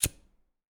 Sound effects > Objects / House appliances
Striking a yellow BIC lighter in a room next to a window on a cloudy day without rain